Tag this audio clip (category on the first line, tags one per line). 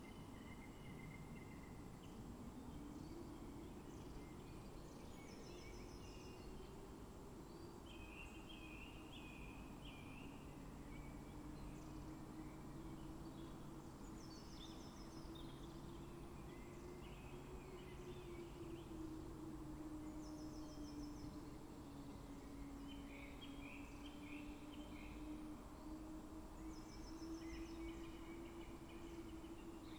Soundscapes > Nature
field-recording
sound-installation
raspberry-pi
phenological-recording
data-to-sound
natural-soundscape
artistic-intervention
modified-soundscape
soundscape
weather-data
nature
Dendrophone
alice-holt-forest